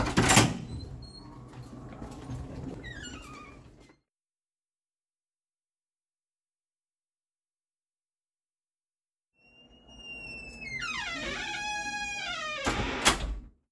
Sound effects > Other mechanisms, engines, machines
DOORHydr-Samsung Galaxy Smartphone, CU Automatic Door, Open, Close, Creaks Nicholas Judy TDC
An automatic door opening and closing with creaks. Recorded at Bon Secours St. Mary's Hospital.
open, squeak, foley, door, automatic, creak, Phone-recording, close